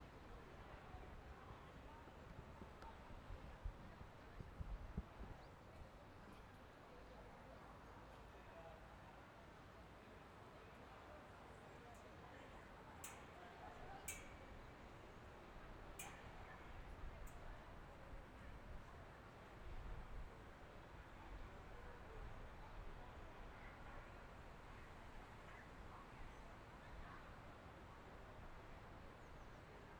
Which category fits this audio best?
Soundscapes > Urban